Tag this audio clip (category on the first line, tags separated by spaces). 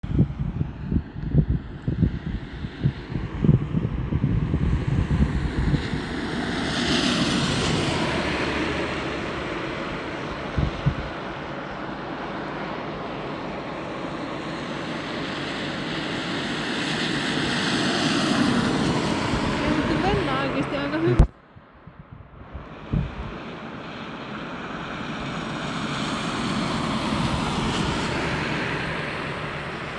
Soundscapes > Urban
car city driving tyres